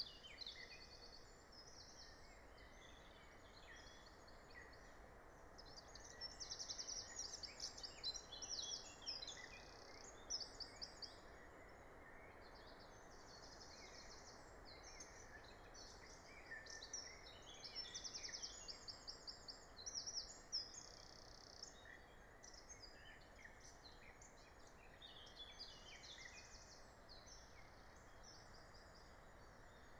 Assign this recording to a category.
Soundscapes > Nature